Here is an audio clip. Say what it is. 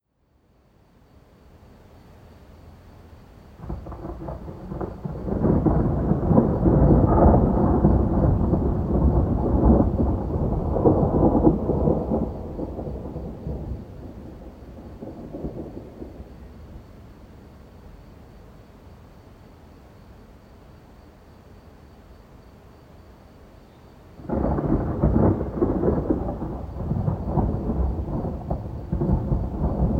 Soundscapes > Nature
Summer Thunder
The rumble of thunder followed by a thundershower. The background sound is calm at first, with summer crickets, then the rain arrives. I recorded this sound in a backyard surrounded by stone walls and pavers. * No background noise. * No reverb nor echo. * Clean sound, close range. Recorded with Iphone or Thomann micro t.bone SC 420.
ambience, ambient, blast, bolt, crickets, detonation, downpour, electricity, explosion, field-recording, flash, heat, hot, lightning, nature, outburst, rain, raining, rolling-thunder, rumble, rumbling, shower, storm, summer, thunder, thunderbolt, thunder-storm, thunderstorm, water, weather